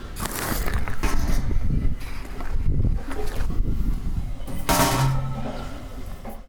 Sound effects > Objects / House appliances
Junkyard Foley and FX Percs (Metal, Clanks, Scrapes, Bangs, Scrap, and Machines) 135
Robot, Machine, trash, Smash, tube, Perc, rattle, dumping, waste, Environment, Metallic, scrape, Ambience, garbage, rubbish, Atmosphere, Junk, Clank, dumpster, SFX, Robotic, Bang, Metal, Clang, Percussion, Bash, Dump, Foley, FX, Junkyard